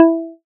Instrument samples > Synths / Electronic
Synthesized pluck sound
pluck, additive-synthesis